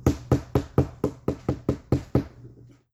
Sound effects > Objects / House appliances
GAMEBoard-Samsung Galaxy Smartphone, MCU Chess, Piece, Hits, On Each Board Nicholas Judy TDC
Chess piece hits on each board tile.